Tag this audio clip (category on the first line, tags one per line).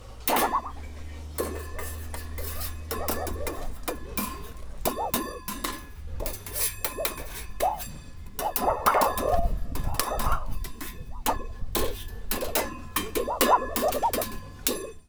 Sound effects > Objects / House appliances
Foley
dumpster
Smash
rattle
Metal
Junk
waste
SFX
Junkyard
Atmosphere
scrape
rubbish
Ambience
Perc
Percussion
tube
garbage
dumping
Clang
Environment
FX
Metallic
Bash
Clank
Dump
Machine
Bang
Robot
Robotic
trash